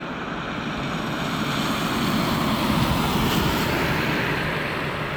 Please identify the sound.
Soundscapes > Urban
Car passing by 17
car, city, driving, tyres